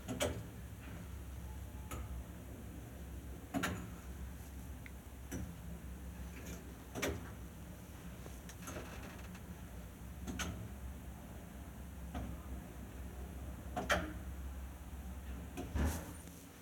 Sound effects > Other mechanisms, engines, machines
Soviet elevator moving and stopping. Recorded with my phone.